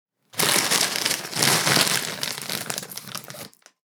Sound effects > Other
FOODEat Cinematis RandomFoleyVol2 CrunchyBites Food.Bag TakeSeveralRiceChipsOut Freebie
bite, SFX, design, rustle, handling, rice, food, bites, bag, crunch, chips, postproduction, snack, plastic, crunchy, sound, effects, recording, foley, texture